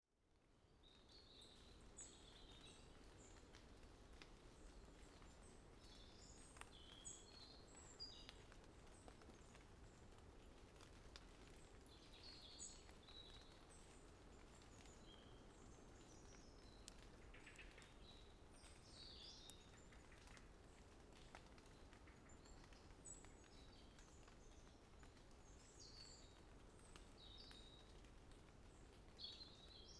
Soundscapes > Nature
Forest. Birds. Rain. Light rain
Forest, Birds, Rain